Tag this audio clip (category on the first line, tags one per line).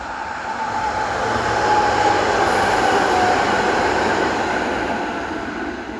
Soundscapes > Urban
Drive-by
field-recording
Tram